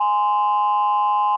Instrument samples > Synths / Electronic
Landline Phonelike Synth G#6
Holding-Tone, JI, JI-3rd, JI-Third, just-minor-3rd, just-minor-third, Landline, Landline-Holding-Tone, Landline-Phone, Landline-Phonelike-Synth, Landline-Telephone, Old-School-Telephone, Synth, Tone-Plus-386c